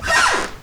Sound effects > Objects / House appliances
Creaking Floorboards 05
walking, room, creaking, old-building, groan, footstep, flooring, squeal, squeaking, wooden, floor, bare-foot, heavy, hardwood, squeaky, old, squeak, grind, rub, floorboard, screech, creaky, grate, scrape, weight, floorboards, going, footsteps, wood, walk